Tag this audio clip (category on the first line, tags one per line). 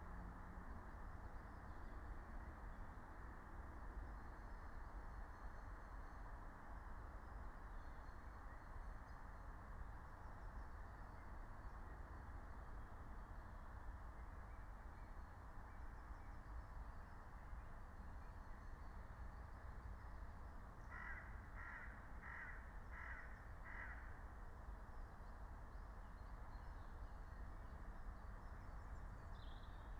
Soundscapes > Nature
natural-soundscape
phenological-recording